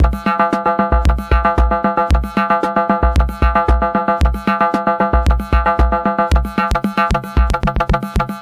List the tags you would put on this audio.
Music > Solo percussion
Analog Bass Drum Electronic music Synth

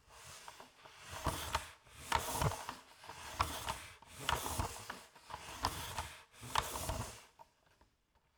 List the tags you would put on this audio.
Sound effects > Objects / House appliances
FR-AV2 cleaner Powerpro Vacum 7000 Tascam Shotgun-microphone vacuum-cleaner Powerpro-7000-series MKE-600 MKE600 Single-mic-mono Shotgun-mic aspirateur Sennheiser vacuum Hypercardioid

